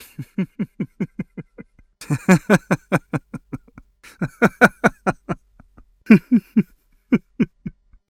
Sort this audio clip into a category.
Sound effects > Human sounds and actions